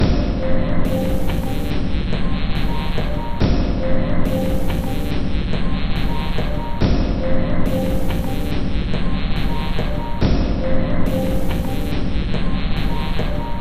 Instrument samples > Percussion
This 141bpm Drum Loop is good for composing Industrial/Electronic/Ambient songs or using as soundtrack to a sci-fi/suspense/horror indie game or short film.
Alien, Ambient, Dark, Drum, Industrial, Loop, Loopable, Packs, Samples, Soundtrack, Underground, Weird